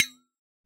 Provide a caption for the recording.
Sound effects > Objects / House appliances
Solid coffee thermos-016
recording, sampling